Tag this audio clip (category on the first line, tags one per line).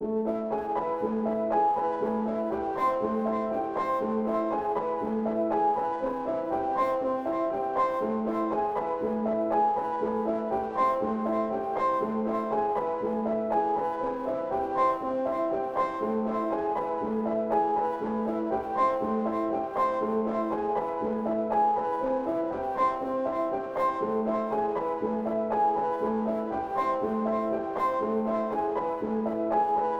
Music > Solo instrument
120
piano
samples